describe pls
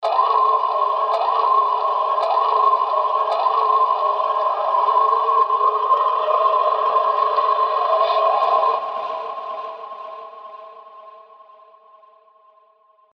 Sound effects > Electronic / Design
Almina Wavetable Singing
Heavy processed scream-like sound from my relative video records
shout, scream, ambience